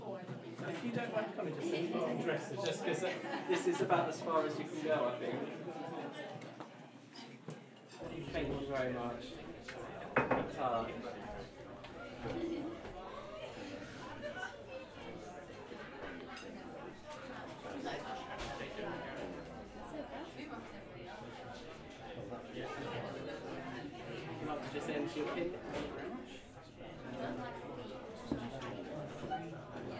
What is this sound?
Soundscapes > Indoors
genteel pub restaurant chatter, happy, peaceful, lively conversation
Country pub atmos, south east England, 9pm. People laughing and chatting, occasional sounds of plates and cutlery, good natured walla
happy, clinking, pub, England, lively, people, restaurant, drink, chat, laughter